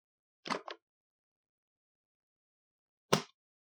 Sound effects > Objects / House appliances
Closed and opened the lid of the electric kettle
The sound you hear when you open the kettle by pressing the button. Recorded on a Samsung Galaxy Grand Prime.
button, Kettle